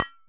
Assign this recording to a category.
Sound effects > Objects / House appliances